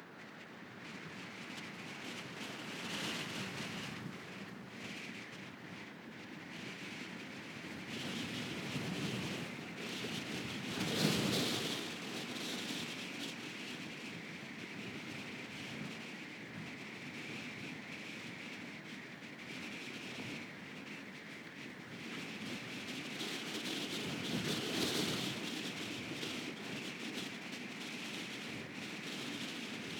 Sound effects > Natural elements and explosions
A tennis racket is set in a appropriated place under a stong wind during low tide.